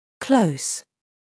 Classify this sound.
Speech > Solo speech